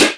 Instrument samples > Percussion
It's a bass hi-hat. This is a bass hi-hat wavefile based on a namesake re-enveloped crash file you can find in my crash folder.